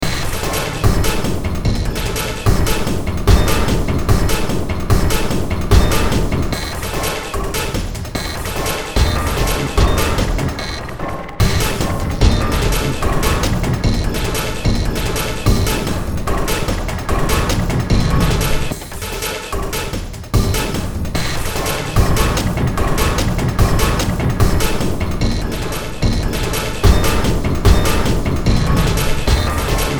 Music > Multiple instruments
Short Track #3120 (Industraumatic)
Ambient, Cyberpunk, Games, Horror, Industrial, Noise, Sci-fi, Soundtrack, Underground